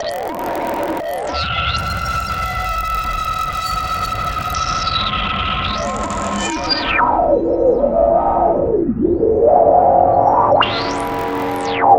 Sound effects > Electronic / Design
Roil Down The Drain 11
dark-design, mystery